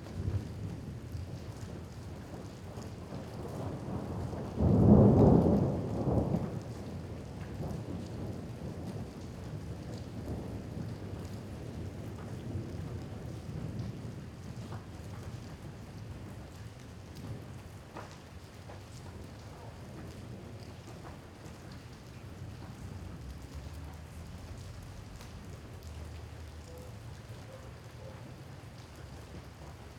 Soundscapes > Nature
Rainy night in southern CDMX. 07/16/25 Recorded with Sound Devices 633 and Audiotechnica AT875R (AB Stereo Bar).